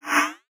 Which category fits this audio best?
Sound effects > Electronic / Design